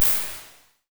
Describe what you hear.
Instrument samples > Synths / Electronic
IR (Analog Device) - Late 90s Soundcraft Signature 12 - PLATE
That device is noisy, but these are Soundcraft Signature 12 inbuilt reverbs :) Impulse source was 1smp positive impulse. Posting mainly for archival, but I will definetly use these!!